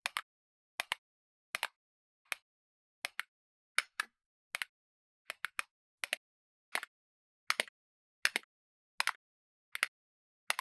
Instrument samples > Percussion
MusicalSpoon Large Multi Strike x15
Wood, Strike, Minimal, Slap, Spoon, Percussion, Musical, Hit, Horse, Gallop